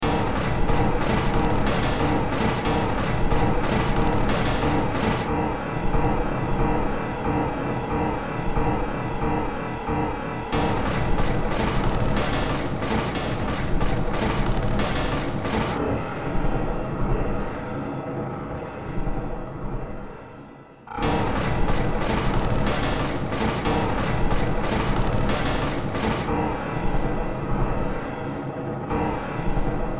Music > Multiple instruments
Short Track #3785 (Industraumatic)
Ambient, Cyberpunk, Games, Horror, Industrial, Noise, Sci-fi, Soundtrack, Underground